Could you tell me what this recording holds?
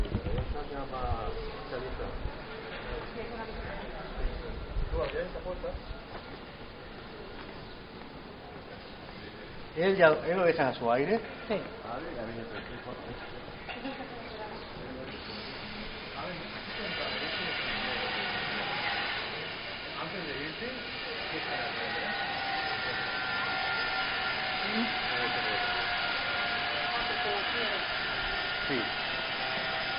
Sound effects > Human sounds and actions
20250326 CarrerdeSantAdria2 Humans Traffic Construction Monotonous
Construction, Humans, Monotonous, Traffic